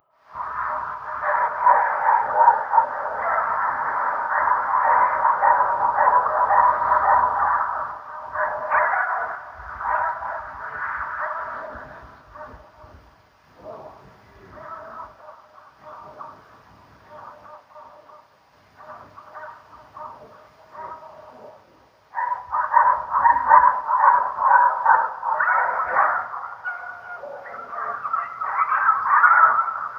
Soundscapes > Urban
a flock of feral dogs howling. recorded on a shitphone so a lot of noise cancellation and eqing added, segments with people yelling outside for no reason and me creating noise by moving the smartphone against crap removed, + a bit of reverb. recommended to season with even more reverb for taste
night, dogs, field-recording, ambience